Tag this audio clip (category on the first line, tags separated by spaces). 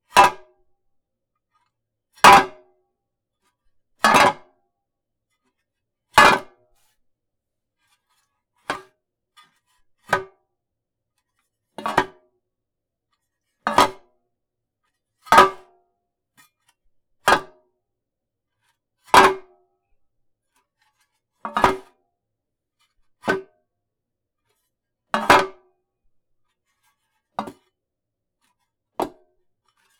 Sound effects > Objects / House appliances
hit metal thud